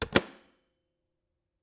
Sound effects > Objects / House appliances
250726 - Vacuum cleaner - Philips PowerPro 7000 series - Closing accessories compartment
aspirateur, FR-AV2, MKE-600, MKE600, Powerpro, Shotgun-mic